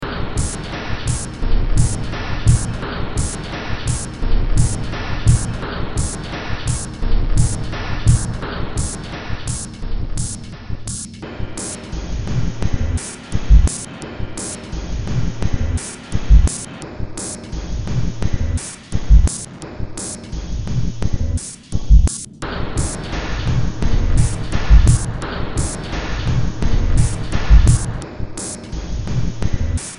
Music > Multiple instruments
Short Track #3580 (Industraumatic)
Ambient, Cyberpunk, Games, Horror, Industrial, Noise, Sci-fi, Soundtrack, Underground